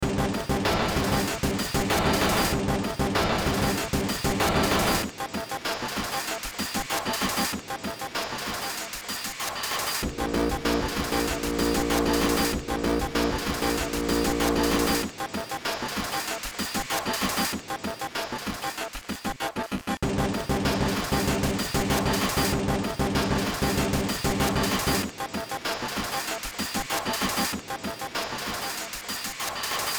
Music > Multiple instruments
Short Track #3164 (Industraumatic)
Ambient, Cyberpunk, Games, Horror, Industrial, Noise, Sci-fi, Soundtrack, Underground